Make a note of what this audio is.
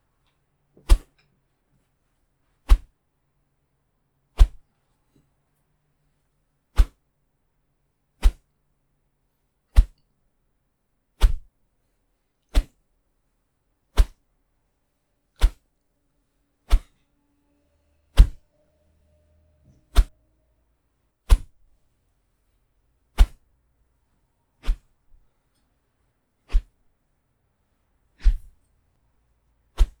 Sound effects > Other
Whooshes and Slashes
These are a bunch "Whooshing" and "Slashing" sounds. I just swung a rubber spatula near my Blue Yeti Microphone, and removed the background noise so it's only the whooshes. My arm got tired after the recording session. Who knew that waving a spatula around can be so tiresome??